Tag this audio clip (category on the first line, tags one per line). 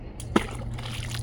Sound effects > Natural elements and explosions
rock
splash
water